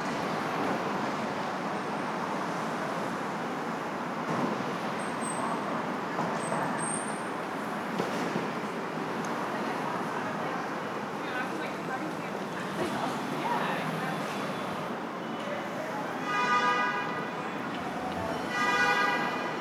Soundscapes > Urban
Downtown City Ambiance
When I was living in San Francisco, one night, I walked down to near Union Square, and set down my recorder. Not a long loop, but it's quality. Simple sounds of the city, cut to loop for any form of application. Recorded with a Tascam DR-100mkii, processed in Pro Tools.
ambiance, ambient, city, downtown, field-recording, loop, people, soundscape, street, traffic, urban